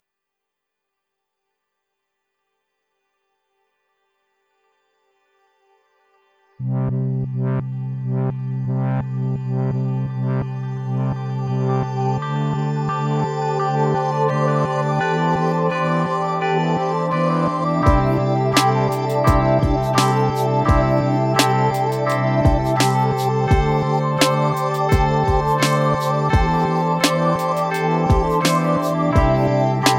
Music > Multiple instruments
lighthearted and truthful music sample
simple early 2000's sounding sample